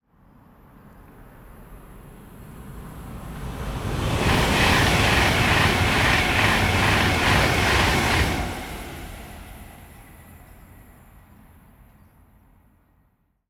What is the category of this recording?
Soundscapes > Nature